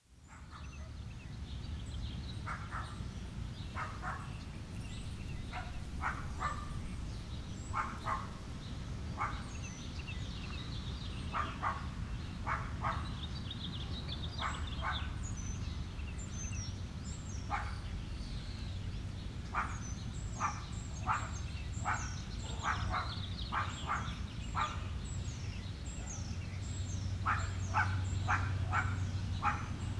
Soundscapes > Nature
Spring morning yard plane clipping weeds MKH416 x 2 4 24 2025 mix 2
A comforting soundscape of a small town in Illinois during spring when the birds are very busy establishing territories. This soundscape also features the slow, easy droning of several private airplanes flying lazy ovals in the blue warm sky. My neighbor also can be heard trimming hedges and cutting old, dry flower stalks. Enjoy, and I hope this recording takes you to a peaceful place of your home. Recorded on a Friday afternoon, April 25, 2025. Equipment: Recorder: Marantz PMD 661 Microphones: Two Sennheiser MKH 416 microphones arranged in ORTF configuration on a stand two feet above the ground.